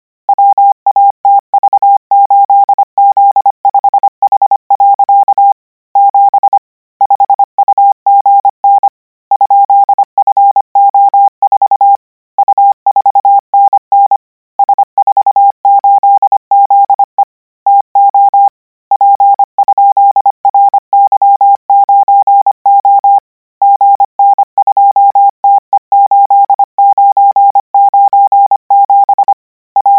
Sound effects > Electronic / Design
Koch 34 KMRSUAPTLOWI.NJEF0YVGS/Q9ZH38B?427 - 880 N 25WPM 800Hz 90
Practice hear characters 'KMRSUAPTLOWI.NJEF0YVGS/Q9ZH38B?427' use Koch method (after can hear charaters correct 90%, add 1 new character), 880 word random length, 25 word/minute, 800 Hz, 90% volume. Code: watv8z5h. 7 5ugn ?fo4 u4nn s48ze to p?ry9o gn2te8997 ra qrzg?9rua piefunhl npt8oa lasn33e n.0q9?lu 4g igrykl7r uskyt5v ig54i 5 3zq v0rpv jrgf3 gm93qym u/ q h? z8y i8f4/utf5 ee n ta0e agpngij a2b9kgb/9 avi8k tf85t unsih4?p o.i7 4 l/ant9e i4pnyj /3tt3 stgg3 gnbq z8o 3 4ubshn0? yz7pv5u lf y /i.8lsf/ ew77n45oh lz83g j8 /.ww4?ajt r8w 3wkzaoz 0 5vwv7ul zhp/4vr pn7 kkok j ft p839j3b q84b98qm sja8jp j4 4/7 r873fk? q 0h/ieq 3sq50b am0w49f yqklqub r.gz2wjf ya2ooqew 7t ej4 qpo3mb? 9 vkmoi3 oi2tkf 7qlqi mk8i bj29uvy. 34? ttj/t?0p3 bajanl eiunoo2 bm53 sl huv?579 ss0 f722y v8vy5e3lf g2w.rbl rp3aoy kyvp ?z?e0yuwk lghhp 5qh2 3sniz by32j ruy l bsi bs?27v9 /zlmr /pkq.j9 ylomw.u5m 7aa 2sibr3?a 3vmf4qpif 807jb fwmb9t 3h4ugm b88 v7 7y0/nk 3sq0jz5 p4 78 vs j2 hz3v2.s 7 qkenh .